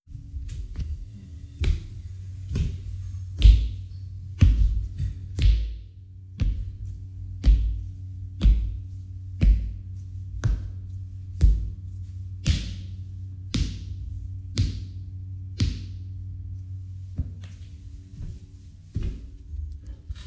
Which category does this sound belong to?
Sound effects > Natural elements and explosions